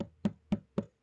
Sound effects > Other
i made this sound by banging my fingernails into my mousepad